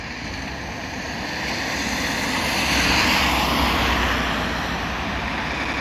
Soundscapes > Urban
auto5 copy
car, traffic, vehicle